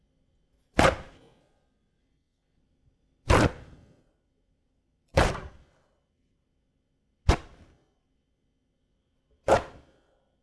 Sound effects > Objects / House appliances
A couple of different paper towel ripping sounds. Could be used as foley for something else like scraping something against something else, or maybe even some kind of gun firing? Recorded on Zoom H6 and Rode Audio Technica Shotgun Mic.
rip, ripping, tear, tearing